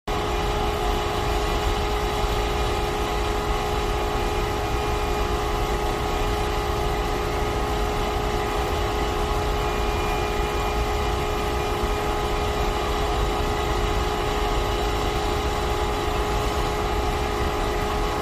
Sound effects > Other mechanisms, engines, machines
Small Machine Hum SFX
This sound captures the low-pitch motor as a lathe is running making parts.